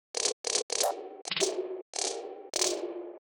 Soundscapes > Synthetic / Artificial
Glitchy Sound effect
clip from a weird drone Sound effect made by me
glitch, freaky, lo-fi, effect, sfx, abstract, Sound, digital, design, sounddesign, weird, electric, strange, future, fx